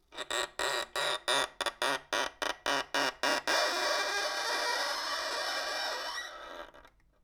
Instrument samples > String
Bowing broken violin string 7
creepy, bow, broken, strings, beatup, unsettling, uncomfortable, violin, horror